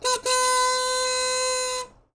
Music > Solo instrument
MUSCInst-Blue Snowball Microphone, CU Kazoo, 'Ta Da!' Accent 01 Nicholas Judy TDC
A kazoo 'ta-da!' accent.
kazoo, accent, Blue-brand, ta-da, cartoon, Blue-Snowball